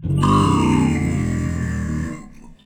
Sound effects > Experimental
Creature Monster Alien Vocal FX-52
fx, Vocal, Groan, Echo, Animal, Creature, Otherworldly, Snarl, Sounddesign, sfx, scary, Growl, Deep, Monstrous, demon, Snarling, Ominous, Monster, boss, visceral, gutteral, evil, Vox, Fantasy, gamedesign, Frightening, Sound, Reverberating, devil, Alien